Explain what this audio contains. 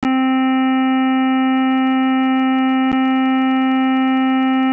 Instrument samples > Synths / Electronic
Clarinet Synth Sustained C4
Clarinet, Wind, Synth, Sustained